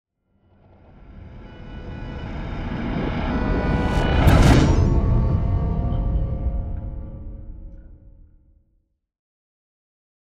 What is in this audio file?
Sound effects > Other
Riser Hit sfx 119
Riser Hit powerful ,cinematic sound design elements, perfect for trailers, transitions, and dramatic moments. Effects recorded from the field.
riser, thud, boom, epic, sweep, cinematic, whoosh, industrial, movement, tension, impact, stinger, sub, transition, implosion, trailer